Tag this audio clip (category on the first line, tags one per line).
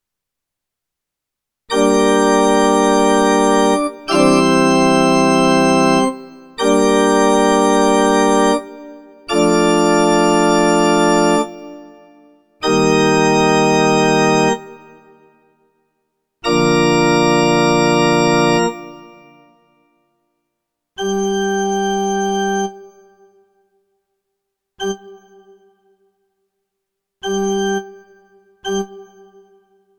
Instrument samples > Piano / Keyboard instruments
Yamaha
instruments